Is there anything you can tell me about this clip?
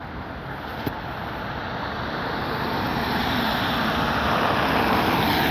Soundscapes > Urban
cars driving past in rain
vehicle, car, engine